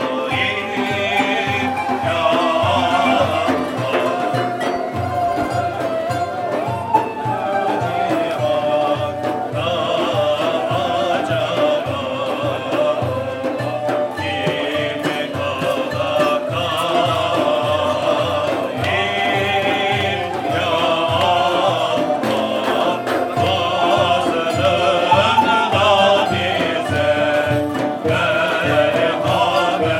Music > Multiple instruments
Sufi music concert in Istambul Madrasa
05/08/2025 - Istambul, Turkey Sufi music concert I recorded in one of Istambul Madrasas Zoom H2N